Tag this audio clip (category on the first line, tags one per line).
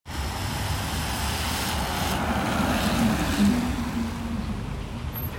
Sound effects > Vehicles
rain
tampere
vehicle